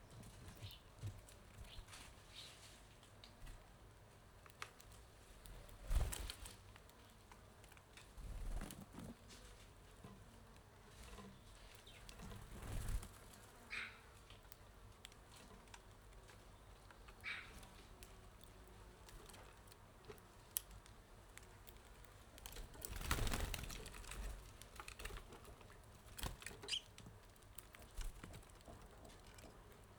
Sound effects > Animals
20250905 18h36 Gergueil - Birdfeeder A-B 1m DJI3
Subject : A A-B 1m wide recording of a bird-feeder in Gergueil. Mics were both about 1m away from feeder but up a tree where the birds hang out. Date YMD : 2025 September 05 around 18h36 Location : Gergueil 21410 Cote d'or France Hardware : DJI mic 3 A-B configuration, internal recording original. Weather : Little wind, some clouds nice temp but on the cold side. Processing : Synced trimmed and normalised in Audacity. Notes : They're eating sunflower seeds :) Tips : ITD stereo, not suited for speaker audio.
Cote-dor, 21410, flaps, MIC-3, picking, A-B, France, ITD